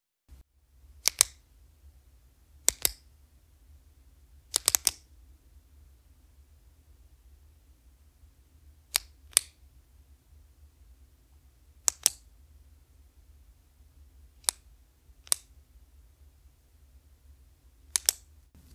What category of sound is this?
Sound effects > Objects / House appliances